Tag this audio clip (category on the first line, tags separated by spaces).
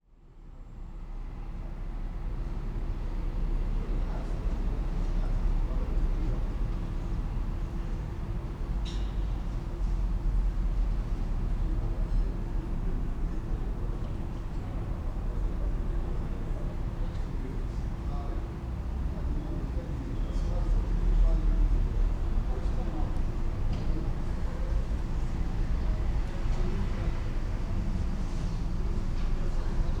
Soundscapes > Urban
ambiance ambience ambient atmosphere city field-recording France general-noise Montreuil people rumor soundscape street surburb traffic urban